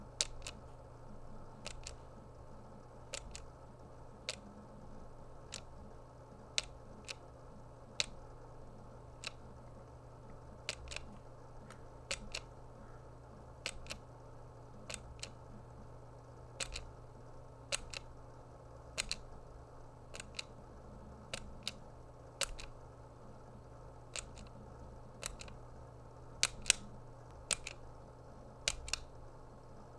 Sound effects > Objects / House appliances
MACHAppl-Blue Snowball Microphone Hair Dryer, Cool Button, Pressing Nicholas Judy TDC
Someone pressing on a cool button of a hair dryer.
Blue-brand, Blue-Snowball, button, cool, foley, hair-dryer